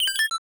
Sound effects > Electronic / Design
GRAND CIRCUIT UNIQUE CHIP
SYNTHETIC, SHARP, CIRCUIT, EXPERIMENTAL, INNOVATIVE, OBSCURE, HIT, DING, HARSH